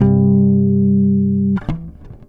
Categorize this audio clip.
Instrument samples > String